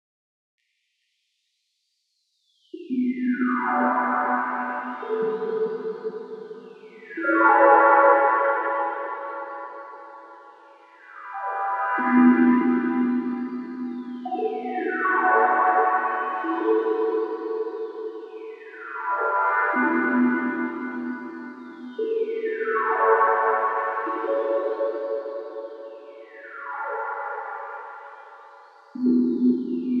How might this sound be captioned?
Music > Solo instrument
Underwater Piano Reverse Chords
Created the piano chords with LABS, before exporting them and reversing the audio file; drastically altered the sound with reverb and spectral gate.
botanica, ambient, piano, ethereal, chords, calm, reverse, chill